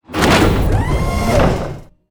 Sound effects > Other mechanisms, engines, machines
Sound Design Elements-Robot mechanism-020
actuators, automation, circuitry, clanking, clicking, design, digital, elements, feedback, gears, grinding, hydraulics, machine, mechanical, mechanism, metallic, motors, movement, operation, powerenergy, processing, robot, robotic, servos, sound, synthetic, whirring